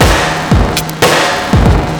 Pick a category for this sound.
Music > Solo percussion